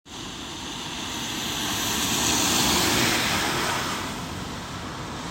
Sound effects > Vehicles
The sound of a car/cars passing. Recorded in Tampere on iPhone 14 with the Voice Memos app. The purpose of recording was to gather data from vehicles passing by for a binary sound classifier.